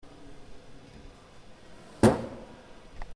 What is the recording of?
Sound effects > Other mechanisms, engines, machines
Book magnetizer
Sound of the book security activator machine in the library of the Faculty of Arts and Humanities at the University of Porto. Recorded with a Tascam DR-40X.
books, library, security